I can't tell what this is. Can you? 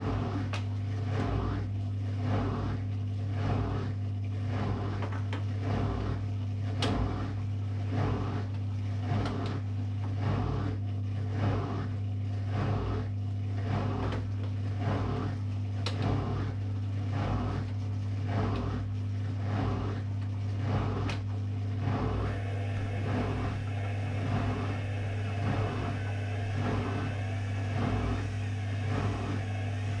Sound effects > Objects / House appliances
The washing machine. Several different spin cycles.
The sound of multiple washing and drying cycles. Recorded from a close distance. The ZOOM F5Studio recorder was used.